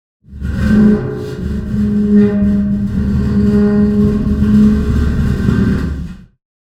Sound effects > Other
Raw Industrial Recordings-Scratching Metal 004
Audio recorded by me. Field recording equipment: Tascam Portacapture x8 and microphone: RØDE NTG5. Raw recording file, basic editing in Reaper 7.